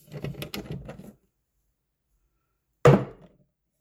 Sound effects > Objects / House appliances
OBJHsehld-Samsung Galaxy Smartphone, CU Paint Can, Lift, Set Down Nicholas Judy TDC
A paint can lifted and setted down.